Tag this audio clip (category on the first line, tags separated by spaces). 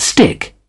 Speech > Solo speech
english word pronunciation voice